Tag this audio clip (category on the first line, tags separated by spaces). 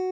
Instrument samples > String
arpeggio tone cheap sound design guitar stratocaster